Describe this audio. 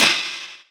Instrument samples > Percussion
crash Sabian low-pitched 1 long
bang, clang, clash, Sinocymbal, metal, Meinl, Stagg, flangcrash, hi-hat, Paiste, ride, low-pitched, crunch, China, sinocrash, Zildjian, UFIP, metallic, sizzle, boom, Chinese, cymbal, Sabian, Soultone, crack, crash, Istanbul, smash, shimmer